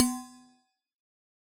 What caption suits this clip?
Sound effects > Objects / House appliances
Resonant coffee thermos-032
percusive, recording, sampling